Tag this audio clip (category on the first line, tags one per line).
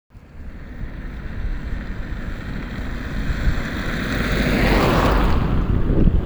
Sound effects > Vehicles
traffic
vehicle
car